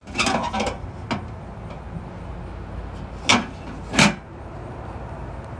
Sound effects > Objects / House appliances
Mailbox Open-Close
A metal mailbox attached to the side of a house, is opened and then closed.
household, postal, house, postbox, home, Mailbox, post, mail